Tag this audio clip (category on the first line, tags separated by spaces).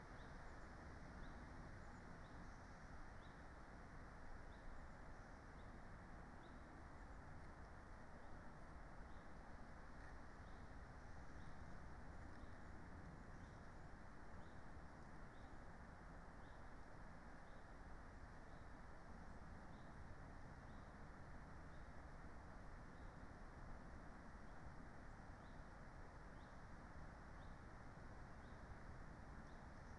Soundscapes > Nature
raspberry-pi Dendrophone natural-soundscape nature artistic-intervention phenological-recording sound-installation alice-holt-forest data-to-sound weather-data soundscape modified-soundscape field-recording